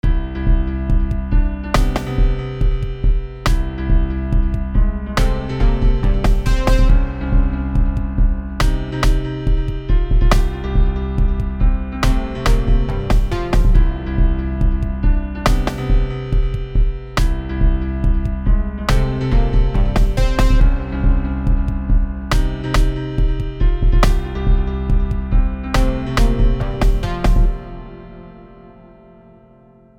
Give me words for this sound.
Music > Multiple instruments
Electronic music - Dendrofil w stolarni
adventure, cinema, film, game, movie, score, short, trailer, victory